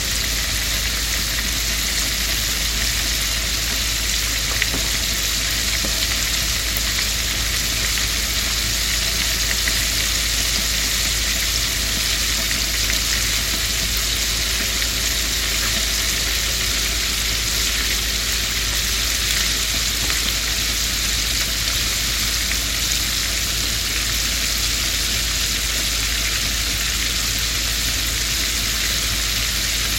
Sound effects > Objects / House appliances
FOODCook-CU Fried Chicken Legs, Batter, Sizzling, Bubbling, Looped Nicholas Judy TDC
Fried chicken legs being cooked. Looped.